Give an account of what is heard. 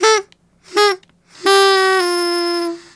Sound effects > Electronic / Design
Paper party horn fail honk sound jingle.